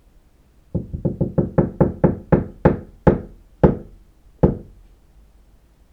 Sound effects > Objects / House appliances
Subject : A door hinge making this noise as it swings open narually. As if someone was knocking at it. Date YMD : 2025 04 19 Location : Indoor Gergueil France. Hardware : Tascam FR-AV2, Rode NT5 XY Weather : Processing : Trimmed and Normalized in Audacity.